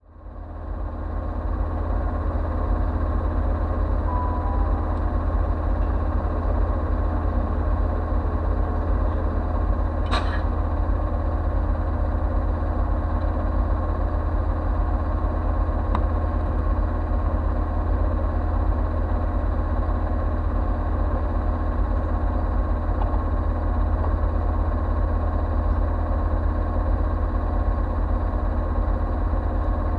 Sound effects > Other mechanisms, engines, machines
A recording of a contact mic attached to the inside of a train from a recent trip to London.